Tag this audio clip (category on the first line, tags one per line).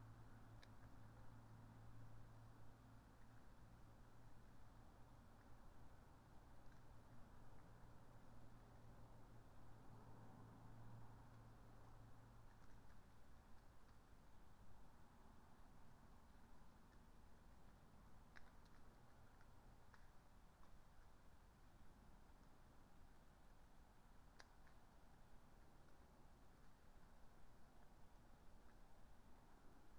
Soundscapes > Nature
alice-holt-forest
artistic-intervention
Dendrophone
field-recording
modified-soundscape
natural-soundscape
nature
phenological-recording
raspberry-pi
sound-installation
weather-data